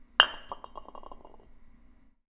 Sound effects > Objects / House appliances
A glass bottle being set down on a concrete floor (in the recycling room). Recorded with a Zoom H1.